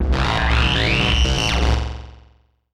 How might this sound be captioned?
Sound effects > Experimental
Analog Bass, Sweeps, and FX-146
oneshot, pad, basses, trippy, electronic, robotic, sample, snythesizer, synth, sfx, korg, scifi, sci-fi, effect, sweep, retro, robot, weird, fx, analogue, alien, complex, machine, bass, analog, vintage, electro, dark, mechanical, bassy